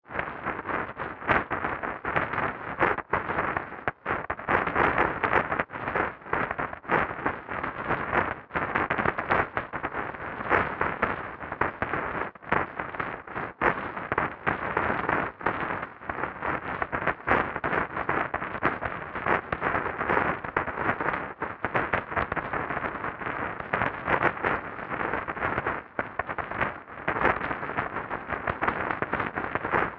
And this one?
Sound effects > Objects / House appliances
Error TV Noise 4
Error, Noise, Radio, TV